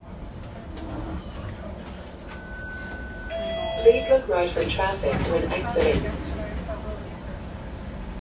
Soundscapes > Urban
TTC Streetcar announcement reminding riders to "Please Look Right for Traffic Before Exiting" on a 506 Carlton streetcar. Recorded with iPhone 14 Pro Max, on September 27 2025.